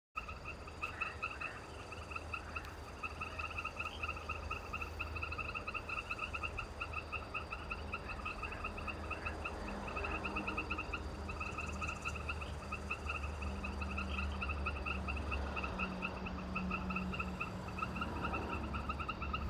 Sound effects > Animals
Birds and frogs
birds, forest, frogs, nature, toads